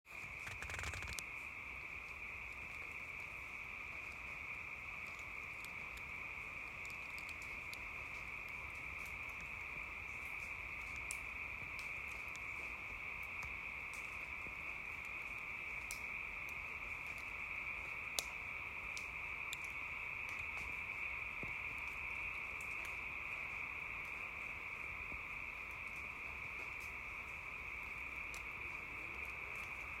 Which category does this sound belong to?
Soundscapes > Nature